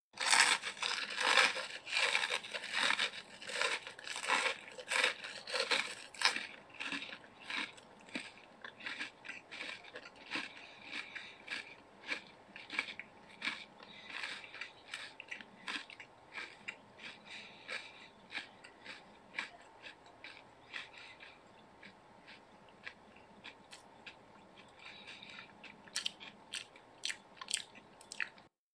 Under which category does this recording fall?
Sound effects > Human sounds and actions